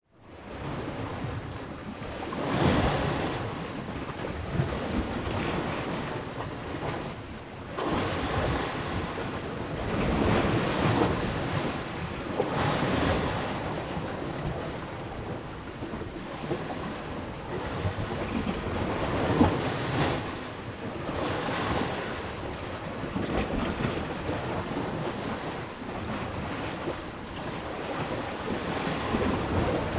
Soundscapes > Nature
Medium Waves Splashing Against Big Stone Wall
Recorded with Zoom H6 XY-Microphone. Location: Agistri / Greece, located 10m above the waves on the stone wall